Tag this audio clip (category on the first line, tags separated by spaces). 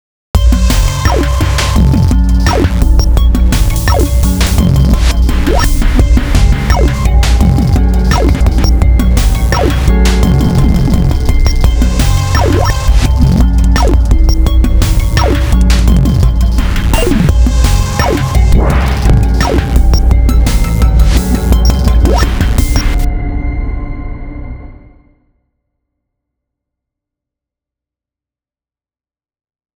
Music > Multiple instruments
melody idm hop